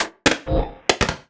Sound effects > Electronic / Design
SFX MagicReload-02
Whatever bullet you loaded sounds like it's got some extra spice to it. Magic, perhaps? Variation 2 of 4.
clip, magic